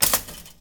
Sound effects > Objects / House appliances
Home recording of a toaster pop. Recorded with a Rode NT1-A.
kitchen, toast, bread, toaster